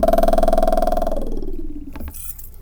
Sound effects > Objects / House appliances
knife and metal beam vibrations clicks dings and sfx-099
ting, FX, Klang, Trippy, metallic, Vibrate, Vibration, SFX, Perc, Wobble, Foley, Metal, ding, Clang, Beam